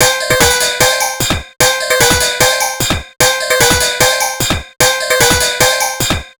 Music > Multiple instruments
A short loop i made in FURNACE TRACKER.
Intermission, Furnace-tracker, music